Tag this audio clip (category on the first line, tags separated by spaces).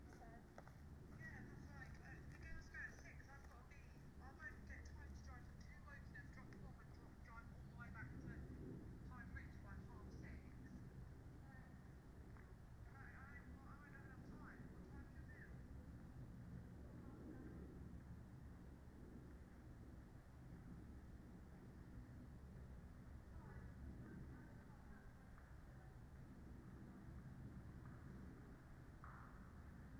Soundscapes > Nature
field-recording raspberry-pi artistic-intervention Dendrophone weather-data sound-installation soundscape alice-holt-forest natural-soundscape phenological-recording modified-soundscape data-to-sound nature